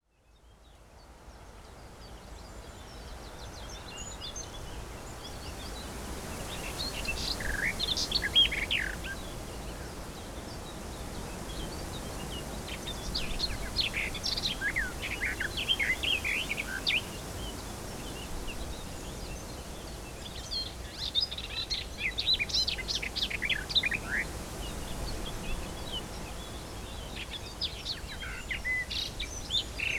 Soundscapes > Nature
A morning recording at Gentleshaw Common, Staffordshire.